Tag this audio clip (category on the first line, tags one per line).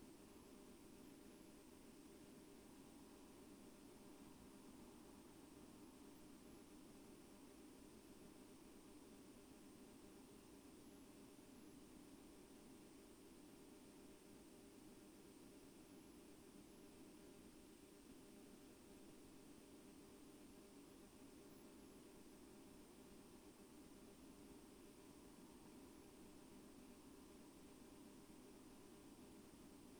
Soundscapes > Nature
alice-holt-forest
artistic-intervention
data-to-sound
Dendrophone
field-recording
natural-soundscape
nature
phenological-recording
sound-installation
soundscape
weather-data